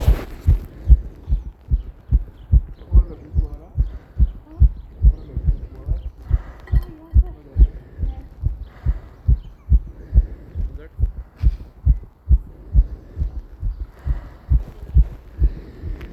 Sound effects > Human sounds and actions
Fast heartbeats after 3000m run
just put my phone on my chest after having ran 3000 meters (i am very out of shape so it took long lol) i was outside and not alone, so theres a bit of background noise